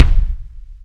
Instrument samples > Percussion
It's a clicky kick. • bassdrum/kick: 18×22" DW Collector's maple • cloned 4 times and blended with low-pitched versions __________________________________________________ DW Drums | Drum Workshop Inc.
attack, bass, bass-drum, bassdrum, beat, death-metal, drum, drums, fat-drum, fatdrum, fat-kick, fatkick, forcekick, groovy, headsound, headwave, hit, kick, mainkick, metal, natural, Pearl, percussion, percussive, pop, rhythm, rock, thrash, thrash-metal, trigger